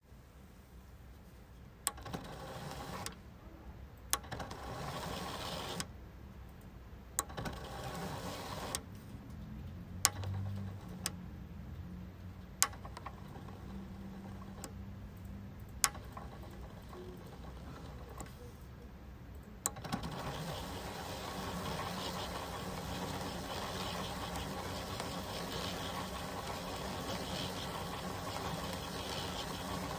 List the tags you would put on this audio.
Sound effects > Other mechanisms, engines, machines
35mm archive books collection documents enlarge film images library light microfilm microscopic newspapers optical plastic reader reading records research scanner screen

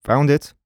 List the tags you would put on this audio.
Speech > Solo speech
Generic-lines Single-mic-mono 2025 MKE600 Sennheiser Tascam FR-AV2 Hypercardioid VA mid-20s july Shotgun-microphone found-it Shotgun-mic Male Voice-acting

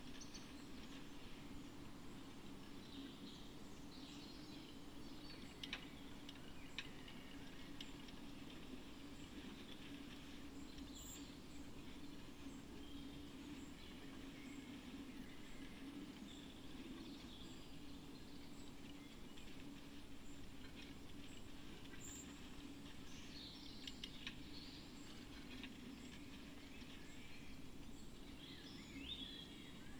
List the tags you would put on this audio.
Soundscapes > Nature
data-to-sound phenological-recording soundscape alice-holt-forest artistic-intervention raspberry-pi weather-data field-recording natural-soundscape Dendrophone modified-soundscape nature

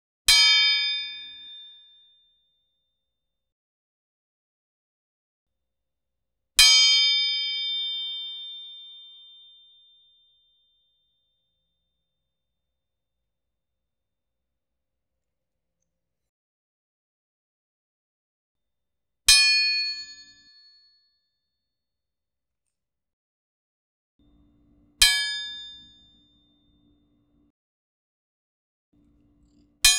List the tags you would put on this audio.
Sound effects > Objects / House appliances
fighting duel swords knight tools metal hit kung-fu battle attack sword martialarts melee fight weapon karate ring weapons medieval ringout blade combat crowbar metallic zing

